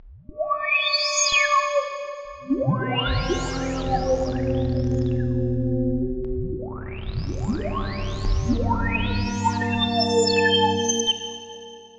Soundscapes > Synthetic / Artificial
PPG Wave 2.2 Boiling and Whistling Sci-Fi Pads 18
cinematic, content-creator, dark-design, dark-soundscapes, dark-techno, drowning, horror, mystery, noise, noise-ambient, PPG-Wave, science-fiction, sci-fi, scifi, sound-design, vst